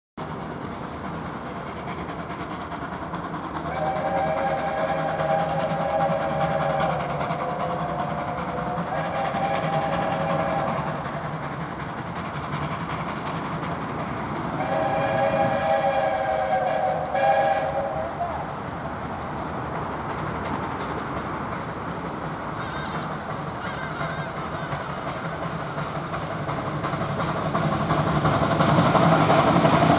Sound effects > Other mechanisms, engines, machines
Umgeni steam railway on the move from a distance to past the microphone. Captured at Gillitts station in Durban South Africa.